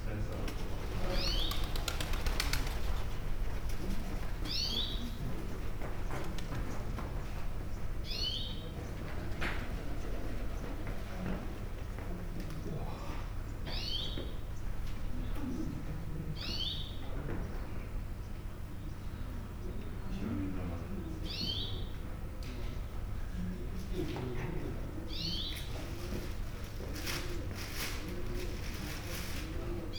Soundscapes > Urban
250725 09h17 Albi Cloître Saint Salvy OKM1
Subject : Cloître Saint Salvy ambience, facing north. Date YMD : 2025 July 25 Location : Albi 81000 Tarn Occitanie France. Soundman OKM1 Binaural in ear microphones. Weather : Light grey sky (with small pockets of light). A few breezes About 16°c Processing : Trimmed and normalised in Audacity.